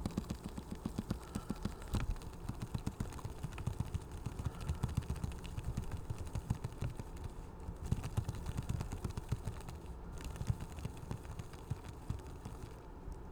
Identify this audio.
Sound effects > Animals
A cricket scurrying.